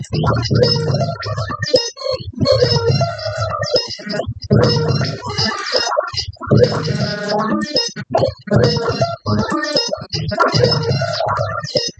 Sound effects > Electronic / Design
Stirring The Rhythms 15
content-creator, dark-design, dark-soundscapes, dark-techno, drowning, glitchy-rhythm, industrial-rhythm, noise, noise-ambient, PPG-Wave, rhythm, science-fiction, sci-fi, scifi, sound-design, vst, weird-rhythm, wonky